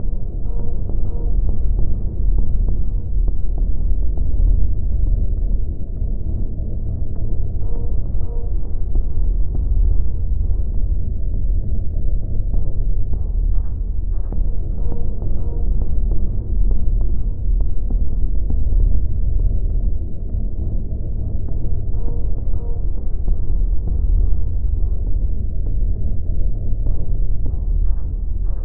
Instrument samples > Percussion
This 67bpm Horror Loop is good for composing Industrial/Electronic/Ambient songs or using as soundtrack to a sci-fi/suspense/horror indie game or short film.
Alien, Ambient, Dark, Drum, Industrial, Loop, Loopable, Packs, Samples, Soundtrack, Underground, Weird